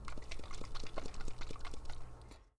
Sound effects > Objects / House appliances
TOYMisc-Blue Snowball Microphone Magic 8 Ball, Shake 01 Nicholas Judy TDC
Blue-brand, Blue-Snowball, foley, magic-8-ball, shake